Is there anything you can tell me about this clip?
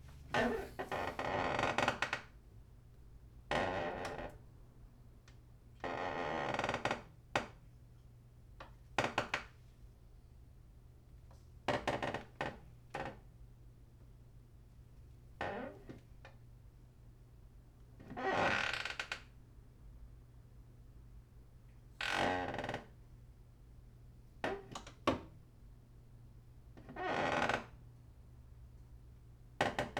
Sound effects > Objects / House appliances
squeaking of rotating desk chair
An old rotating office chair that squeaks when turned while sitting on it. Recorded with Zoom H2.
chair, creak, office, rotating, squeak